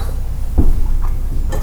Sound effects > Objects / House appliances
knife and metal beam vibrations clicks dings and sfx-094
SFX, Beam, ding, FX, Wobble, ting, Clang, Klang, Vibration, Foley, Trippy, metallic, Vibrate, Metal, Perc